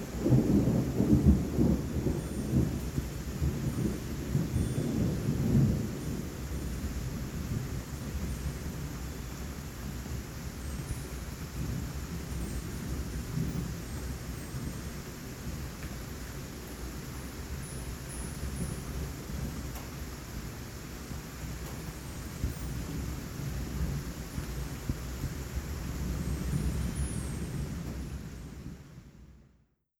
Sound effects > Natural elements and explosions
Thunder rumbling with wind chimes in distance.